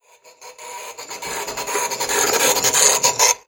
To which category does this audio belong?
Sound effects > Objects / House appliances